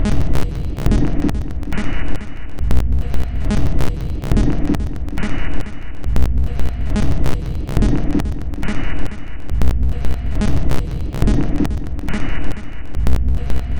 Instrument samples > Percussion
This 139bpm Drum Loop is good for composing Industrial/Electronic/Ambient songs or using as soundtrack to a sci-fi/suspense/horror indie game or short film.
Soundtrack, Industrial, Dark, Underground, Loopable, Loop, Ambient, Samples, Drum, Alien, Packs, Weird